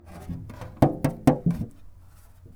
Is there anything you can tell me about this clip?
Sound effects > Other mechanisms, engines, machines
Woodshop Foley-094
bam, bang, boom, bop, crackle, foley, fx, knock, little, metal, oneshot, perc, percussion, pop, rustle, sfx, shop, sound, strike, thud, tink, tools, wood